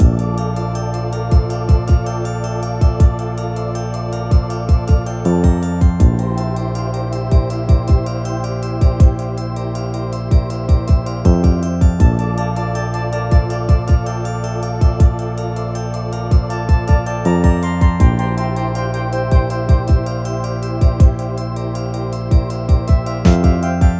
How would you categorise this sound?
Music > Multiple instruments